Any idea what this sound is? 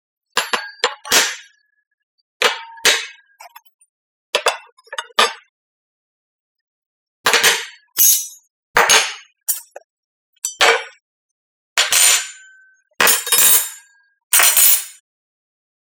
Sound effects > Objects / House appliances
Forks or knives being placed on a table. Recorded with Zoom H6 and SGH-6 Shotgun mic capsule.